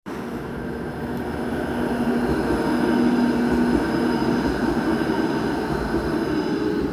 Soundscapes > Urban
voice 19-11-2025 1 tram
Tram, Rattikka, TramInTampere